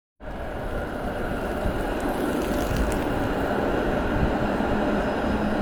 Sound effects > Vehicles
A Tram passes by
Tram, Passing